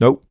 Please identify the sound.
Speech > Solo speech
Displeasure - No
dialogue, displeasure, displeasured, FR-AV2, Human, Male, Man, Mid-20s, Neumann, no, nope, NPC, oneshot, refusal, singletake, Single-take, talk, Tascam, U67, Video-game, Vocal, voice, Voice-acting, Word